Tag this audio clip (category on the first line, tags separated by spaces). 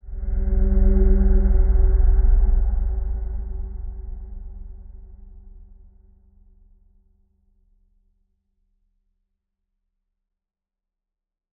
Sound effects > Electronic / Design
ambient dark drone pad